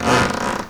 Sound effects > Objects / House appliances
Creaking Floorboards 15
scrape, old, creaking, groan, going, flooring, bare-foot, footstep, floorboard, grind, footsteps, heavy, squeaking, wooden, walking, floorboards, squeal, room, grate, squeaky, hardwood, floor, wood, rub, screech, old-building, weight, walk, squeak, creaky